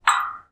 Sound effects > Objects / House appliances
My own recording, recorded on a zoom box